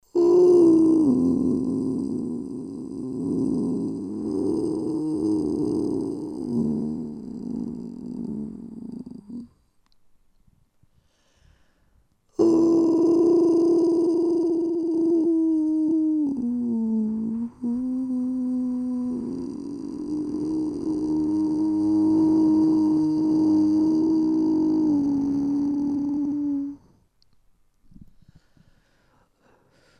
Sound effects > Experimental
VOICE FOR FXs
female, fx, speak, girl, vocal